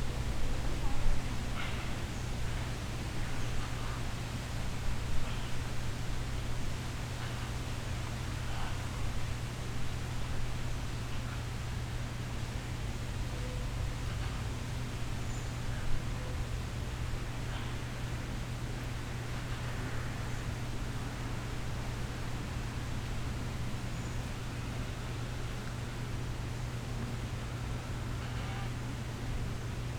Soundscapes > Nature
BIRDTrop-Zh6 Three macaws communicating with each other, birds, people, walla, distant traffic, cicadas, INPA, park, near indigenous souvenir store FILI URPRU
Pássaros. Três araras se comunicando, pássaros, pessoas passando, vozerio, trânsito distante, cigarras, INPA (Instituto Nacional de Pesquisa da Amazônia), próximo à loja de itens indígenas. Gravado no INPA, Manaus, Amazonas, Amazônia, Brasil. Gravação parte da Sonoteca Uirapuru. Em stereo, gravado com Zoom H6. // Sonoteca Uirapuru Ao utilizar o arquivo, fazer referência à Sonoteca Uirapuru Autora: Beatriz Filizola Ano: 2025 Apoio: UFF, CNPq. -- Birds. Three macaws communicating with each other, birds, people pass by, walla, distant traffic, cicadas, INPA, park, near indigenous souvenir store. Recorded at INPA, Manaus, Amazonas, Amazônia, Brazil. This recording is part of Sonoteca Uirapuru. Stereo, recorded with the Zoom H6. // Sonoteca Uirapuru When using this file, make sure to reference Sonoteca Uirapuru Author: Beatriz Filizola Year: 2025 This project is supported by UFF and CNPq.
amazonia, nature, macaws, park, amazon, ambience, birds, forest, field-recording, araras